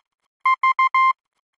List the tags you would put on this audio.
Sound effects > Electronic / Design
Morse
Telegragh
Language

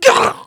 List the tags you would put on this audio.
Sound effects > Human sounds and actions
Human
Scream
Hurt

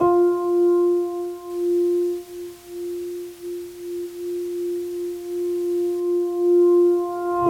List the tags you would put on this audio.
Instrument samples > Piano / Keyboard instruments
warp
transition
tension
piano
8D
edit
reversed
short

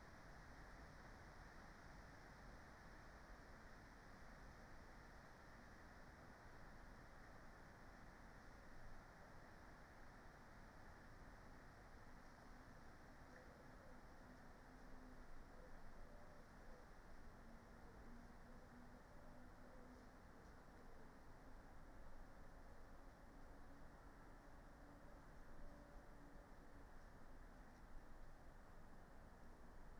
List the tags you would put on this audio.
Soundscapes > Nature
alice-holt-forest
nature
field-recording
weather-data
data-to-sound
artistic-intervention
soundscape
natural-soundscape
modified-soundscape
raspberry-pi
phenological-recording
Dendrophone
sound-installation

